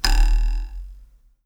Sound effects > Objects / House appliances
The really silly sound that plastic rulers make when you hold them off the side of a table and smack the hanging side. I had accidentally snapped the ruler in half, though. Recorded on a Windows 10 computer with Audacity through a RODE NT1, going through a Behringer U-Phoria UM2. I'm pretty sure I hadn't needed to do any post-processing. (Also the ruler was red, which explains the file name. Don't know why I thought the color was that important...)